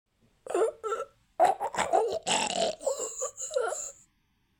Sound effects > Human sounds and actions
choking death sound effect
horror, scary